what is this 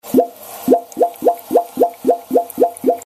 Sound effects > Objects / House appliances
Gurgling sewer
The sound effect of a clogged drain. This sound was recorded by me using a Zoom H1 portable voice recorder.
gurgling, water, sewer, drain, clogged, issue, plughole